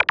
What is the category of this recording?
Sound effects > Electronic / Design